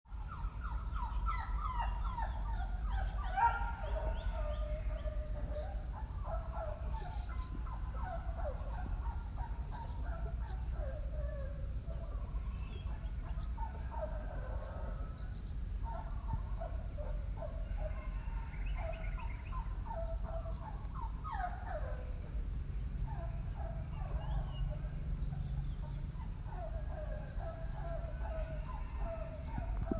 Sound effects > Animals
Chó Con Quậy 2 - Baby Dog 2
Baby dog complain. Record use iPhone 7 Plus smart phone 2025.11.02 06:44
baby, dog, pero, small